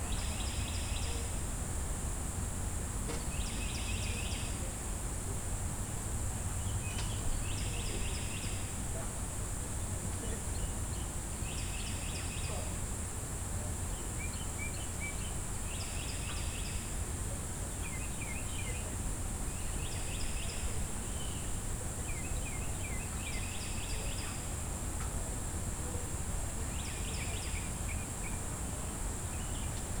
Soundscapes > Urban
AMBSubn-Summer Outside small apartment complex, insects, birds, traffic, Gandy's Apt 1130AM QCF Gulf Shores Alabama Zoom H1n
Small apartment complex on souther gulf coast island, summer morning.